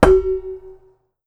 Sound effects > Objects / House appliances
A thin metal ping.